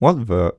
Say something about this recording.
Speech > Solo speech
Surprised - what the 2
dialogue, FR-AV2, Human, Male, Man, Mid-20s, Neumann, NPC, oneshot, singletake, Single-take, surprised, talk, Tascam, U67, Video-game, Vocal, voice, Voice-acting, what